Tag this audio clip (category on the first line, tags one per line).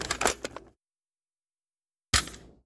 Sound effects > Objects / House appliances
cock
foley
load
nerf
Phone-recording